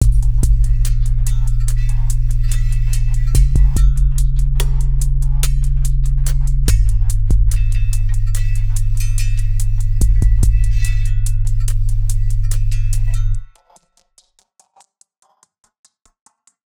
Instrument samples > Synths / Electronic
Chill Sub Minimal Space Beat with hat and kick Loop 72bpm
Subby triphop beat created in FL using vsts such as Borsta, Pendulate, and processed with Reaper